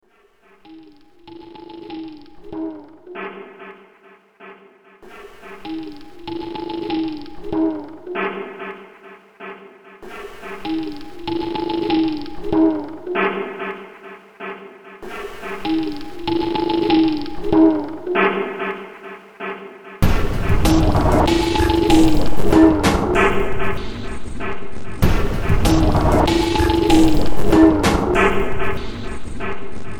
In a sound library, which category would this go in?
Music > Multiple instruments